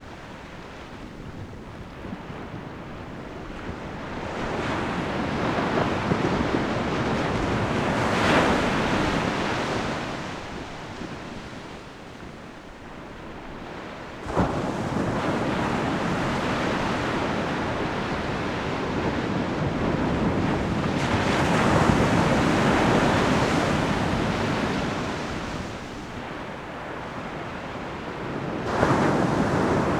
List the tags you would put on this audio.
Soundscapes > Nature
ocean shore waves

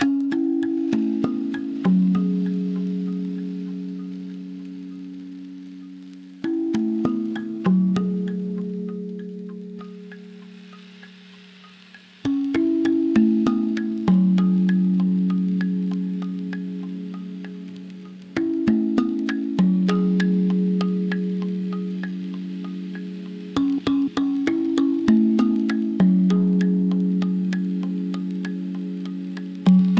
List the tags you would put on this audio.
Music > Multiple instruments
ai-generated ambient atonal background earth experimental meditative organic pad relaxing soil soundscape texture tribal

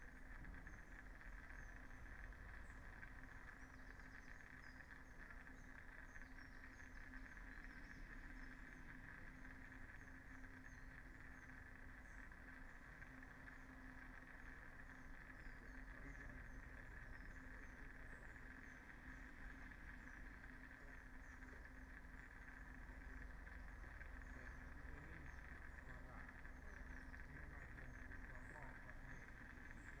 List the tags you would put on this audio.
Soundscapes > Nature
raspberry-pi field-recording sound-installation artistic-intervention data-to-sound nature soundscape weather-data

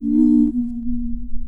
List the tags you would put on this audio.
Sound effects > Human sounds and actions
Harmony Humming Speech UI

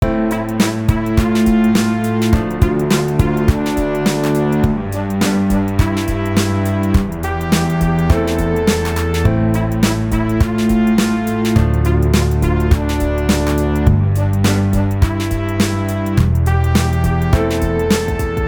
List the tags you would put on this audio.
Music > Multiple instruments
band drum Upright kick snare horn percussion game Loop 104bpm Violin Music beat Cello piano acoustic video Aminor Song muffled Brass upbeat hi-hat trumpet bass kit

